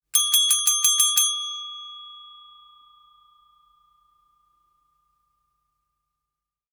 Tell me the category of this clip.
Sound effects > Objects / House appliances